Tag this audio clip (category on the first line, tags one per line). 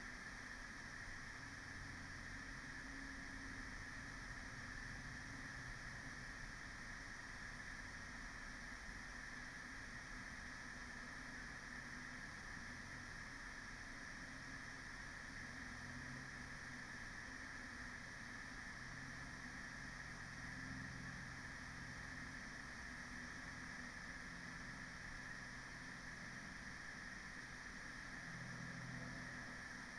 Soundscapes > Nature
sound-installation
Dendrophone
nature
phenological-recording
raspberry-pi
soundscape
modified-soundscape
data-to-sound
artistic-intervention
alice-holt-forest
weather-data
natural-soundscape
field-recording